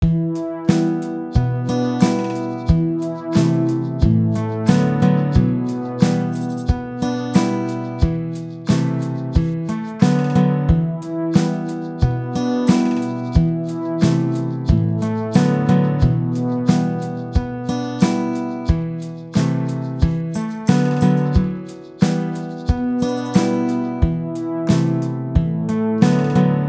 Music > Multiple instruments
Cinematic Guitar
Drums, guitar, piano and synthesizer played on garage band. Not AI, E minor, 83bpm, 4/4 time signature.